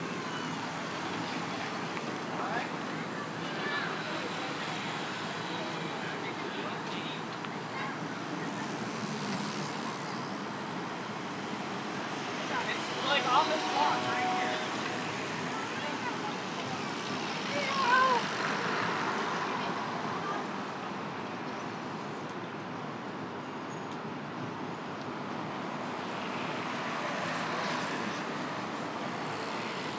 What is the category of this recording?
Soundscapes > Urban